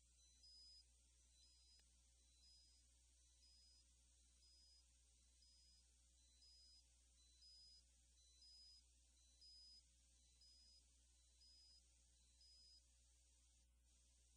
Soundscapes > Indoors
Beeping sound effects.
Beeping from a fridge that has been left open for a long period of time.
beeping, beeps